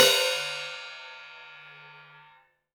Music > Solo instrument
Cymbal Grab Stop Mute-006
Custom, Sabian, Paiste, Drum, Oneshot, Cymbal, Drums, FX, Hat, GONG, Ride, Crash, Cymbals, Perc, Percussion, Metal, Kit